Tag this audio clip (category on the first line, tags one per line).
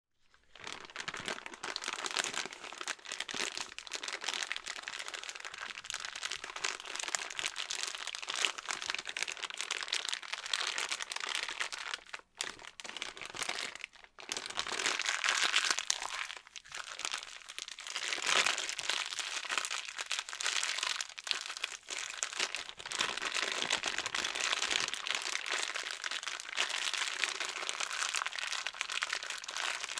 Sound effects > Objects / House appliances
bag
crush
crushing
handle
handling
plastic
sfx
zip-lock